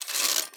Sound effects > Objects / House appliances
A recording of a kitchen bread knife being pulled out of a metal block.